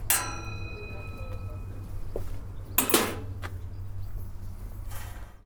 Sound effects > Objects / House appliances
Junkyard Foley and FX Percs (Metal, Clanks, Scrapes, Bangs, Scrap, and Machines) 121
SFX, dumping, Perc, Foley, waste, dumpster, Junkyard, Clang, Junk, Bash, tube, Dump, Metal, FX, rubbish, Bang, Environment, Smash, Clank, Robot, Metallic, Machine, scrape, Ambience, Percussion, rattle, garbage, Robotic, Atmosphere, trash